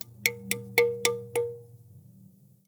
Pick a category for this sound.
Music > Solo instrument